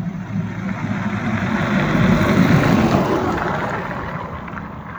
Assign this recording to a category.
Sound effects > Vehicles